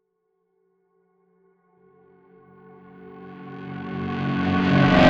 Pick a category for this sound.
Music > Multiple instruments